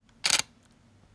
Sound effects > Other mechanisms, engines, machines
Nikon Zf shutter click. Recorded with iPhone voice recover app